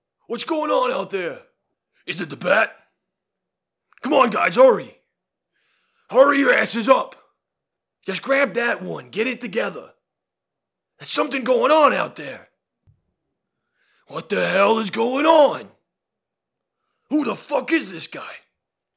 Speech > Solo speech
Henchman #8 Voice Lines
combat, enemy, fighting, gasp, punch, thug